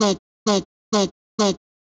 Speech > Solo speech
BrazilFunk Vocal Chop One-shot 22 130bpm
FX, One-shot, BrazilFunk, Vocal